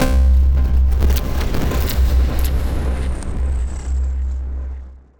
Instrument samples > Synths / Electronic
CVLT BASS 74
bass, bassdrop, clear, drops, lfo, low, lowend, stabs, sub, subbass, subs, subwoofer, synth, synthbass, wavetable, wobble